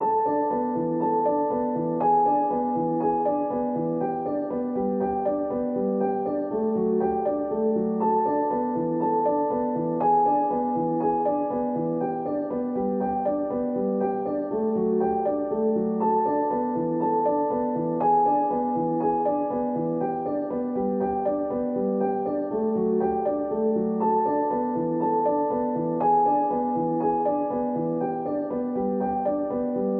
Music > Solo instrument
Piano loops 200 octave short loop 120 bpm
120, 120bpm, free, loop, music, piano, pianomusic, reverb, samples, simple, simplesamples